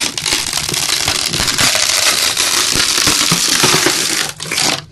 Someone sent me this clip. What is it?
Sound effects > Natural elements and explosions
cracked wood recorded at phone
cracked, phone